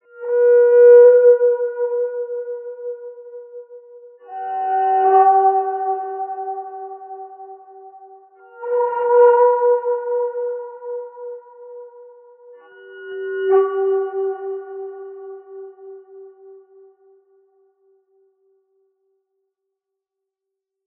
Sound effects > Electronic / Design
HOAR FROST
horn, braam, nordic, drone, ambient, dark